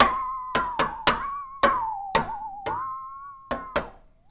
Sound effects > Other mechanisms, engines, machines
Handsaw Pitched Tone Twang Metal Foley 14
vibe, perc, shop, metal, twang, smack, foley